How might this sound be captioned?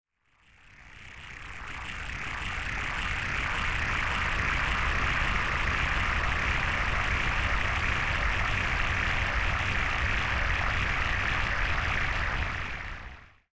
Soundscapes > Other
RGS-Random Glitch Sound 7-Glitch Water Fall-2
Synthed with 3xOsc only. A beat loop from Bandlab as the carrier of the vocodex. Processed with OTT, ZL EQ, Fracture